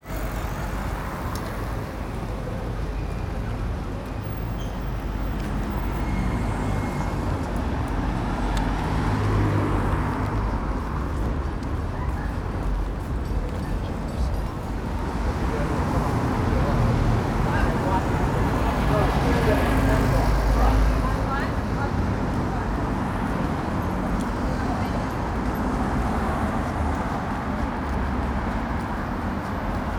Soundscapes > Urban
Busy Night City Main Road - London
Slightly busy main road ambience, recorded in London at night. Includes cars passing by, bicycles passing by, distant horns, passing voices, slight footsteps and various clicking sounds.
ambience, traffic, night, field-recording, city, people, london, noise, busy, street